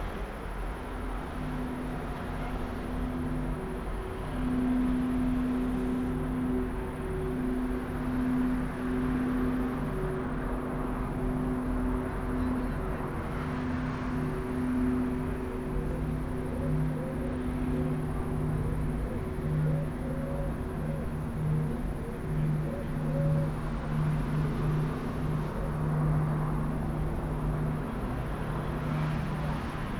Soundscapes > Urban
Empty Streets
Droning atmospheric urban track.
ambient, urban